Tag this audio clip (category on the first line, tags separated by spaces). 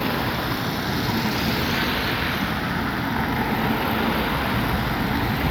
Soundscapes > Urban
car engine vehicle